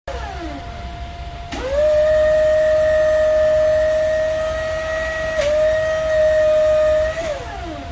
Sound effects > Other mechanisms, engines, machines
Forklift Raises Forks SFX
This sound captures the low-pitch motor as the forks are raised on a forklift.
Forklifts-sfx, Forklift-raising-forks, Mechanical, Factory